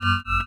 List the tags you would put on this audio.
Sound effects > Electronic / Design
interface
alert
digital
message
selection
confirmation

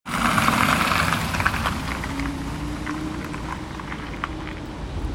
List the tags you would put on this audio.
Sound effects > Vehicles
rain
vehicle
tampere

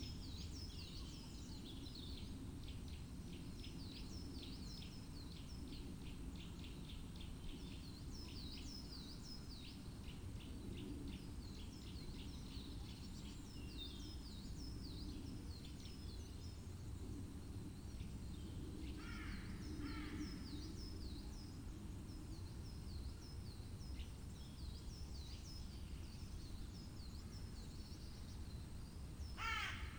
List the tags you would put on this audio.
Soundscapes > Nature
field-recording
sound-installation
Dendrophone
alice-holt-forest
phenological-recording
artistic-intervention
data-to-sound
nature
natural-soundscape
soundscape
raspberry-pi
modified-soundscape
weather-data

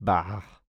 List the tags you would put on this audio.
Speech > Solo speech
annoyed
dialogue
FR-AV2
grumpy
Human
Male
Man
Mid-20s
Neumann
NPC
oneshot
Single-take
talk
Tascam
U67
upset
Video-game
Vocal
voice
Voice-acting

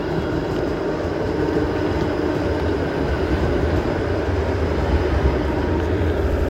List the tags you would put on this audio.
Sound effects > Vehicles
field-recording
tram

